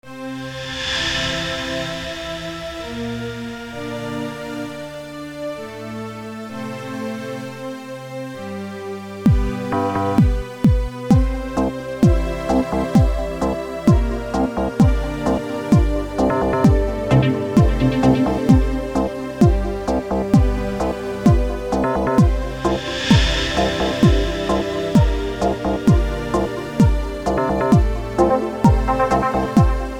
Music > Multiple instruments
Electronic music - Konstabl Ślizgacz
dark, synthwave, movie, suspense, soundtrack, music, fear, game, film, horror, score, creepy